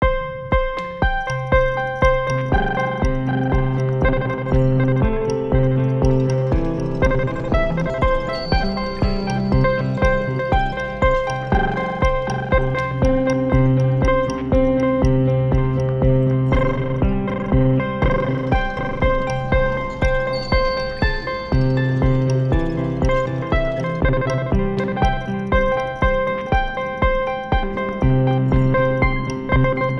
Music > Solo instrument
Vibrating Piano Loop 60 bpm
Piano loop at 60 bpm, vibrating by FM modulation Scale is Pentatonic C Major Piano samples recorded from my piano with Zoom Essential H1n